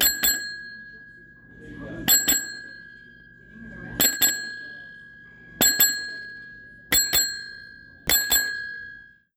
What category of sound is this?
Sound effects > Objects / House appliances